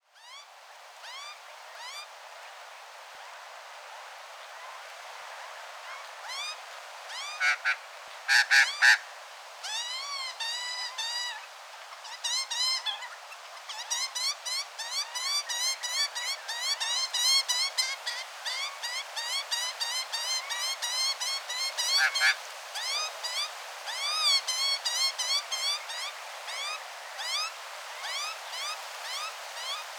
Sound effects > Natural elements and explosions
BIRDSong WINDVege
Infant Magpie crying for a feed with 2 adults nearby feeding it in a tree on a windy day.
birdsong, leaves, birds, sfx, nature, wind, trees